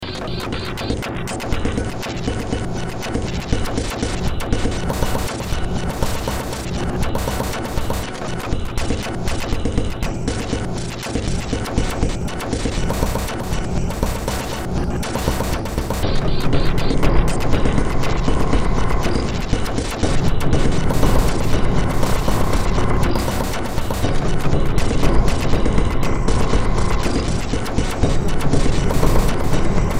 Music > Multiple instruments
Demo Track #2975 (Industraumatic)
Games, Cyberpunk, Horror, Industrial, Underground, Soundtrack, Noise